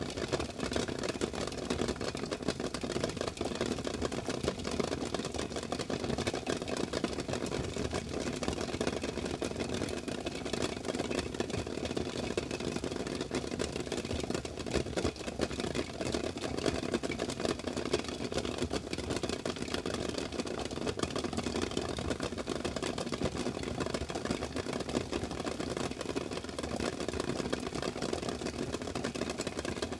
Sound effects > Objects / House appliances
Eggs are boiling in the pot and bouncing around.
The eggs are boiled in a pot. Recorded using a RODE NTG3 microphone and a ZOOM F3 recorder.